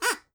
Sound effects > Animals
TOONAnml-Blue Snowball Microphone, CU Duck Whistle, Single Quack 02 Nicholas Judy TDC
A single duck whistle quack.